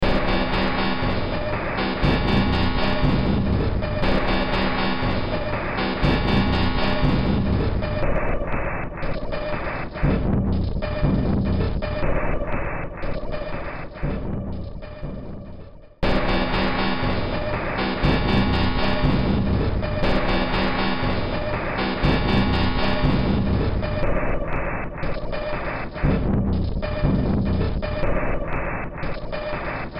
Music > Multiple instruments
Demo Track #3642 (Industraumatic)
Ambient, Horror, Soundtrack, Underground, Industrial, Sci-fi, Cyberpunk, Games